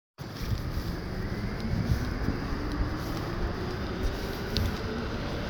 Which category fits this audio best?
Soundscapes > Urban